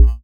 Instrument samples > Synths / Electronic
BUZZBASS 4 Bb
bass, fm-synthesis, additive-synthesis